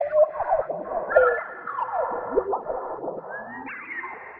Soundscapes > Synthetic / Artificial
LFO Birdsong 67
Birdsong, LFO, massive